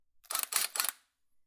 Sound effects > Other mechanisms, engines, machines
Milwaukee impact driver foley-014
a collection of sounds recorded in my wood shop in Humboldt County, California using Tascam D-05 and processed with Reaper
Drill, Foley, fx, Household, Impact, Mechanical, Metallic, Motor, Scrape, sfx, Shop, Tool, Tools, Woodshop, Workshop